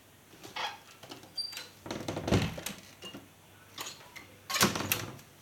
Sound effects > Other mechanisms, engines, machines
Messing with doors on a closet. Recorded with my phone.